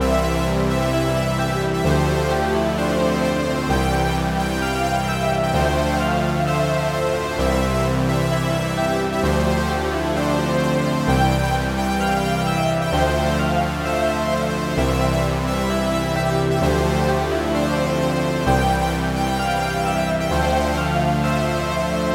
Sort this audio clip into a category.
Music > Multiple instruments